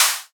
Instrument samples > Synths / Electronic
Hat Open-02
An open hi-hat one-shot made in Surge XT, using FM synthesis.
synthetic, surge, fm, electronic